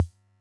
Instrument samples > Percussion
bombo casiotone
Sampleando mi casiotone mt60 con sus sonidos de percusión por separado Sampling my casiotone mt60 percusion set by direct line, sparated sounds!